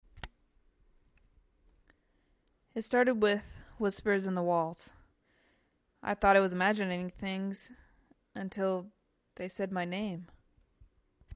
Speech > Solo speech
“The Voice in the Walls” (horror / psychological / haunted)
A chilling hook with ghostly undertones, ideal for haunted house stories or psychological thrillers.
haunted, horror, paranoia, Script